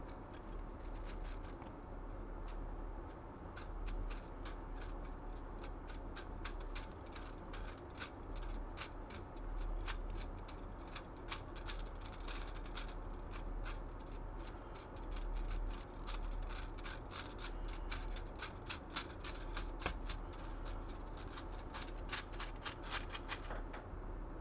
Sound effects > Objects / House appliances
TOYMisc-Blue Snowball Microphone, MCU Slinky, Movements Nicholas Judy TDC
Blue-brand, movement, slinky